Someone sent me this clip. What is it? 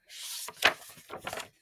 Sound effects > Other
this is a diary of a wimpy kid book being opened in my basement with the bad microphone that came in my computer